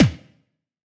Instrument samples > Percussion
snare digital
made with vital
edm, synthetic, drum, snare